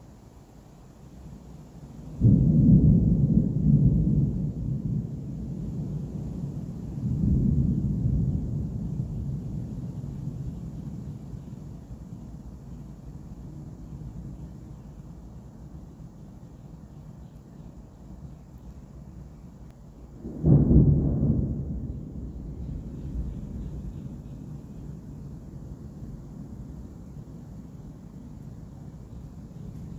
Soundscapes > Nature
STORM-Samsung Galaxy Smartphone, CU Thunder, Booms, Rumbles, Then Heavy Rain Composite Nicholas Judy TDC
Thunderstorm with booms and rumbles, followed by a composite adding heavy rain.